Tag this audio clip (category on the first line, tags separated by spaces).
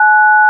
Sound effects > Electronic / Design
retro telephone dtmf